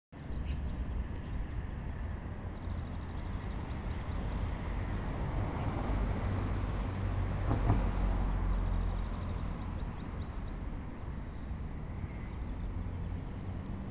Soundscapes > Nature
20240429 0918 birds phone microfone take1
birds near Lidl in Viana do Castelo, captured at 10 meters with a phone microfone
field, recording, atmophere